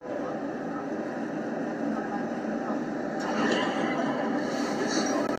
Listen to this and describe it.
Sound effects > Other mechanisms, engines, machines
tram sounds emmanuel 8
23, tram